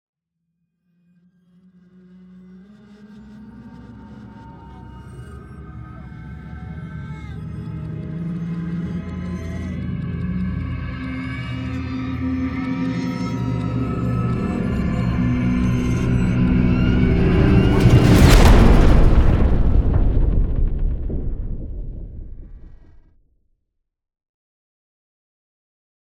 Sound effects > Other
Long Riser Hit PS-002
Effects recorded from the field.